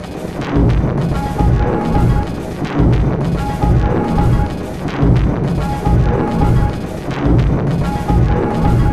Instrument samples > Percussion
This 215bpm Drum Loop is good for composing Industrial/Electronic/Ambient songs or using as soundtrack to a sci-fi/suspense/horror indie game or short film.
Loopable, Ambient, Samples, Industrial, Underground, Loop, Dark, Weird, Packs, Alien, Soundtrack, Drum